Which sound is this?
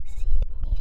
Speech > Processed / Synthetic
Creepy whisper sound Recorded with a Rode NT1 Microphone
ghost, whispering